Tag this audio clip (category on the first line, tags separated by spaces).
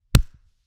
Sound effects > Experimental
vegetable bones punch onion foley thud